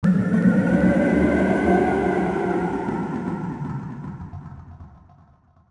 Sound effects > Electronic / Design
Optical Theremin 6 Osc ball infiltrated-011
This is sound from a 6 oscillor driven optical theremin i built, it runs on a 74c14 hex schmitt inverter, 14 pin , and i used old joysticks from ps2 controllers to cut and chop voltage. the sounds are triggered with kight, and in this recording i used ambient light sun beams from mymusic studio skylights , moving the unit in and out while also using my fingers to modulate shadows. it was recorded into my Audiofuse interface into Reaper and further processed with the vst infiltrator
acid, alien, ambient, analog, diy, electro, electronic, experimental, fx, ghost, ghostly, glitch, homemade, idm, loop, noise, processed, sci-fi, sfx, spooky, strange, synth, theremin, theremins, trippy, weird